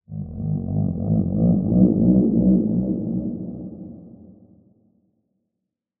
Sound effects > Electronic / Design
Eldritch Monster Cry #1
What is it? The cry of an eldritch creature calling from places best forgotten? A strange machine being activated in the distance? Or maybe a ghostly wail that emanates from the depths, begging you to learn the terrible truth about your surroundings?
bizarre-vocalization, bizarre-voice, creepy-vocalization, deep-eldritch-voice, deep-ghostly-voice, deep-ghost-voice, deep-one-call, deep-one-vocalization, deep-one-voice, eldritch-monster, eldritch-monster-voice, eldritch-voice, ethereal-voice, freaky, ghostly-moan, ghostly-voice, haunting-voice, horror-sound, horror-voice, insane-voice, lovecraft, lovecraftian, monster-cry, nameless-horror, old-one-cry, old-one-voice, spooky-voice, wordless-horror